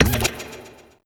Sound effects > Electronic / Design
SFX Spell WhisperedShort-02
A short, intense whisper - just what did they just cast? Variation 2 of 4.
cast, short, speak, spell, vocal, voice, whisper